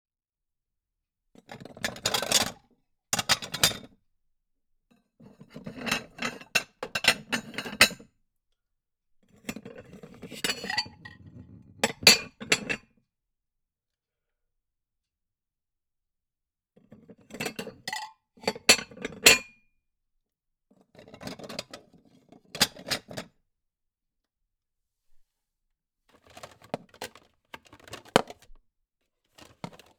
Sound effects > Objects / House appliances
Handling china and plastic plates in the kitchen cupboard. Recorded on Zoom H6 stereo mike and Kork contact mike attached to wooden shelf.